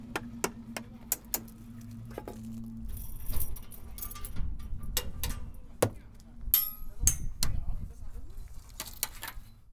Sound effects > Objects / House appliances
Junkyard Foley and FX Percs (Metal, Clanks, Scrapes, Bangs, Scrap, and Machines) 163
Robot, FX, garbage, tube, waste, rubbish, Clang, Junkyard, Foley, Smash, rattle, SFX, Percussion, dumpster, scrape, Metallic, Machine, Ambience, trash, Clank, Bash, Environment, Dump, Metal, Bang, Junk, Perc, dumping, Robotic, Atmosphere